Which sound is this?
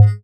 Instrument samples > Synths / Electronic
BUZZBASS 4 Ab
additive-synthesis, bass, fm-synthesis